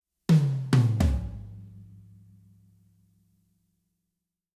Music > Solo percussion
105 BpM - Tom Fill 80's - 03
105bpm, 80s, acoustic, drum-fill, drum-loop, drumloop, Drums, Fill, fill-in, indie, loop, natural-sound, pop, retro, rock, roomy, toms